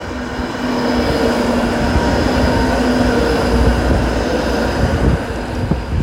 Sound effects > Vehicles
tram-apple-1
outside, tram, tramway, vehicle